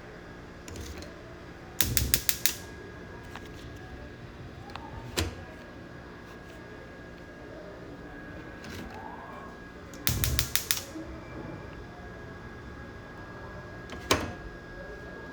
Sound effects > Other mechanisms, engines, machines
FIREGas gas stove light up MPA FCS2
Gas stove lighting up
FIRE, STOVE, GAS